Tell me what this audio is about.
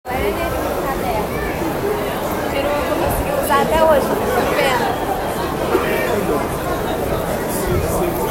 Soundscapes > Urban
People talking portuguese in a crowded mall.
Mall, people, shopping, talk, voices